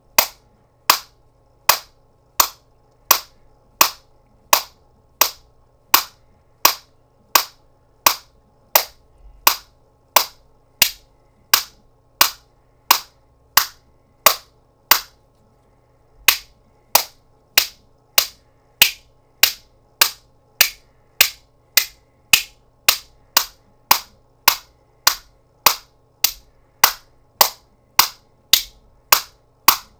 Music > Solo percussion
MUSCPerc-Blue Snowball Microphone, CU Musical Spoons, Single Slaps, Small Metal Clacks Nicholas Judy TDC
Single musical spoon slaps, then small metal spoon clacks. The first sounds are also useful for FGHTImpt sounds - slap, hit.